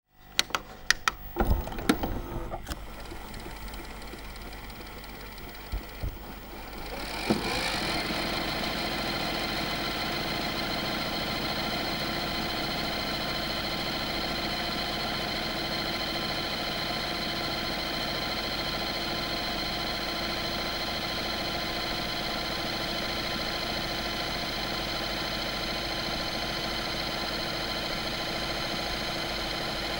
Sound effects > Electronic / Design
Fwd from stopped. Samsung DVD_V6800